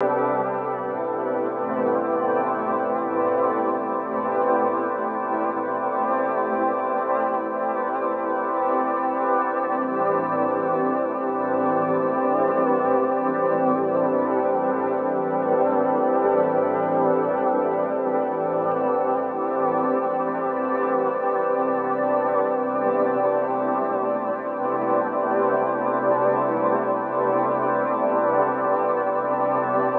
Music > Other
Memories dissipate like clouds after a storm.
lofi, soundscape